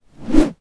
Sound effects > Natural elements and explosions
Stick - Whoosh 12
Subject : A whoosh sound made by swinging a stick. Recorded with the mic facing up, and swinging above it. Date YMD : 2025 04 21 Location : Gergueil France. Hardware : Tascam FR-AV2, Rode NT5. Weather : Processing : Trimmed and Normalized in Audacity. Fade in/out.
FR-AV2, Woosh, stick, SFX, tascam, Rode, Swing, whosh, NT5, swinging, whoosh, Transition, fast